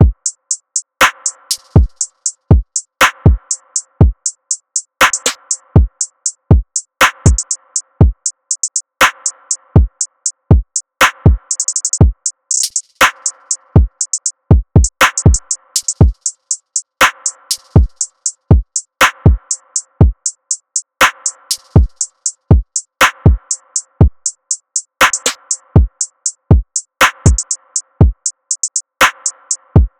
Instrument samples > Percussion
Trap Sample Packs